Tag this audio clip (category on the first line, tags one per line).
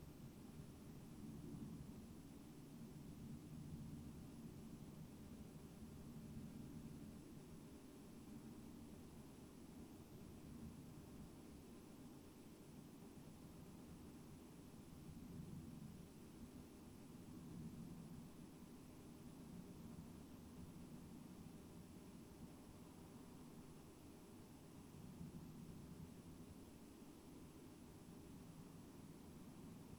Soundscapes > Nature
weather-data
artistic-intervention
field-recording
modified-soundscape
Dendrophone
raspberry-pi
soundscape
natural-soundscape
alice-holt-forest
nature
phenological-recording
data-to-sound
sound-installation